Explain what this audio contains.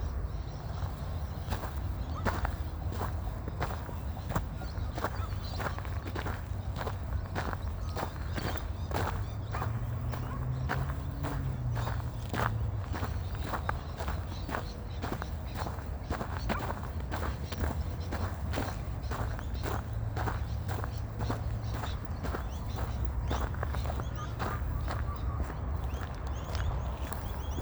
Sound effects > Human sounds and actions
footsteps cronching
Cronch Cronch Cronch! This human sure do be tramping through some dayum cronchy ground! A recording of footsteps over something crunchy, possibly gravel? I can't remember sadly. There are also lots of birds in the background.